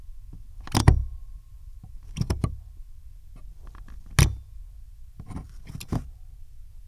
Sound effects > Vehicles
Ford 115 T350 - Cealing light switch
2025, SM57, 2003, Van, A2WS, Tascam, FR-AV2, Ford-Transit, August, Vehicle, Old, France, Mono, 115, Single-mic-mono, 2003-model, T350, Ford